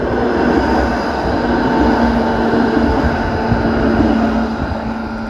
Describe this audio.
Soundscapes > Urban
ratikka8 copy
traffic, tram, vehicle